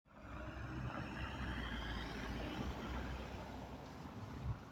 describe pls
Sound effects > Vehicles
car driving by
car, vehicle, driving